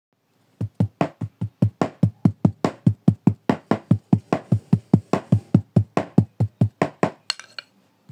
Instrument samples > Percussion
Drum imitation i recorded.